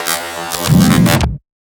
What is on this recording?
Sound effects > Other mechanisms, engines, machines
Sound Design Elements-Robot mechanism-002
actuators, automation, circuitry, clanking, clicking, digital, elements, feedback, gears, hydraulics, machine, mechanical, motors, movement, processing, robotic, servos, whirring